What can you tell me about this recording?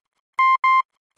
Sound effects > Electronic / Design
A series of beeps that denote the letter M in Morse code. Created using computerized beeps, a short and long one, in Adobe Audition for the purposes of free use.
Telegragh, Language